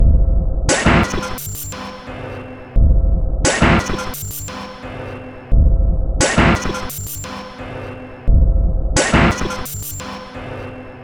Instrument samples > Percussion
This 174bpm Drum Loop is good for composing Industrial/Electronic/Ambient songs or using as soundtrack to a sci-fi/suspense/horror indie game or short film.
Drum, Industrial, Ambient, Samples, Loop